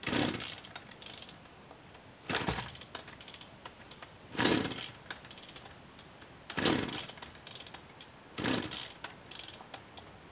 Sound effects > Other mechanisms, engines, machines
saw4pullfail
Partner 351 chainsaw pulling over but not starting, two pulls causing it to pop once. Recorded with my phone.